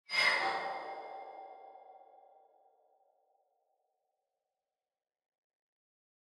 Sound effects > Electronic / Design
Metallic Resonance
These take a lot of effort and time to make.
metal-being-scraped, metallic-resonance, metallic-sound, metallic-vibration, metal-scrape, metal-scratch, metal-scratching, metal-vibration, scraping-metal, scratching-metal, strange-resonance, strange-vibration, unusual-vibration, vibrating-pipe, weird-vibration